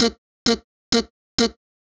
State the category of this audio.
Speech > Solo speech